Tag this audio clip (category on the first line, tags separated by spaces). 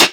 Instrument samples > Percussion
percussion Zildjian drum Paiste closed-hat closed-cymbals cymbal-pedal bronze minicymbal brass drums picocymbal Bosporus hat dark metallic hi-hat hat-cymbal tick crisp hat-set dark-crisp Meinl click Istanbul metal chick-cymbals facing-cymbals snappy-hats Sabian